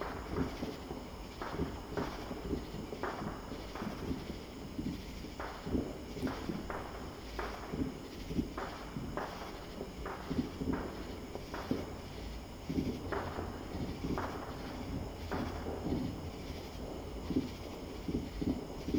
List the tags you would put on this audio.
Sound effects > Other
america
day
electronic
experimental
explosions
fireworks
fireworks-samples
free-samples
independence
patriotic
sample-packs
samples
sfx
United-States

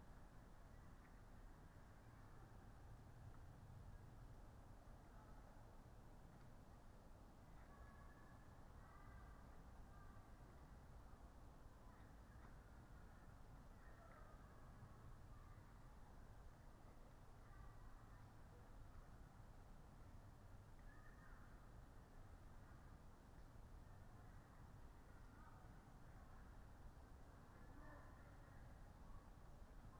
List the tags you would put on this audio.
Soundscapes > Nature
data-to-sound
Dendrophone
field-recording
phenological-recording

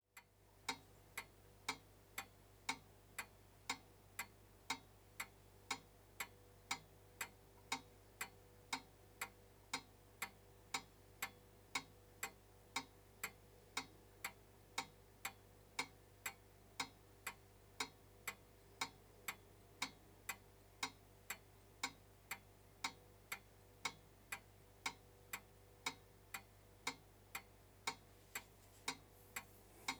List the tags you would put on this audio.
Sound effects > Objects / House appliances
Clock
Mechanical
Metal
Old

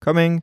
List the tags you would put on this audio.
Speech > Solo speech
Adult
july
Hypercardioid
Sennheiser
mid-20s
2025
coming
Male
FR-AV2
MKE600
MKE-600
VA
movement
Generic-lines
Single-mic-mono
Shotgun-microphone
Shotgun-mic
Voice-acting
Calm
Tascam

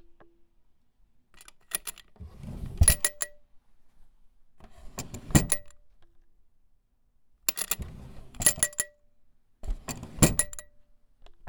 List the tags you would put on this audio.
Sound effects > Objects / House appliances
open
dresser
drawer

